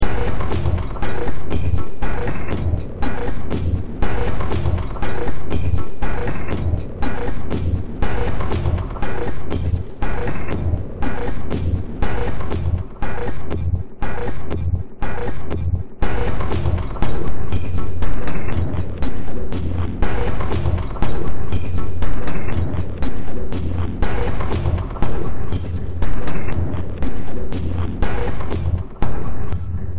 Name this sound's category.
Music > Multiple instruments